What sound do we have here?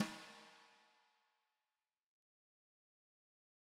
Music > Solo percussion
Snare Processed - Oneshot 62 - 14 by 6.5 inch Brass Ludwig
snare drum 14 by 6.5 inch brass ludwig recorded in the soundproofed sudio of Calupoly Humboldt with an sm57 and a beta 58 microphone into logic and processed lightly with Reaper
kit, ludwig, perc